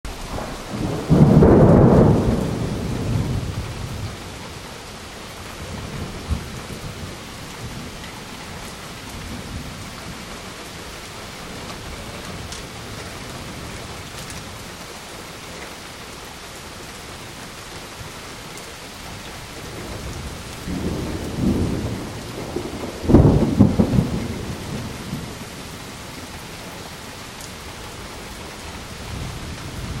Sound effects > Natural elements and explosions
Summer thunderstorm with sounds of rain and thunder
lightning, rain, raining, thunder, thunderstor, weather